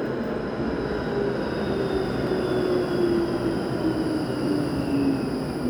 Soundscapes > Urban
voice 3 17-11-2025 tram
Tram, TramInTampere